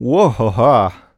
Speech > Solo speech
Surprised - WhoHoaha
Male, Man, Mid-20s, Single-take, Tascam, Voice-acting, wow, wowed